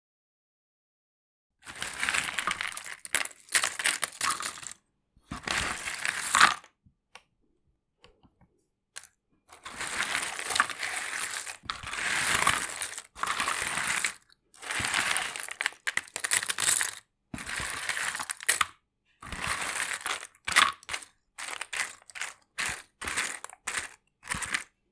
Sound effects > Objects / House appliances
GAMEBoard Handling Jigsaw Puzzle Pieces in a box2
Handling jigsaw puzzle pieces in a cardboard box. Recorded with an H4n with the addition of a Korg contact mic on the cardboard box to enhance the low end.
handling, moving, carboard, jigsaw, box, puzzle, sfx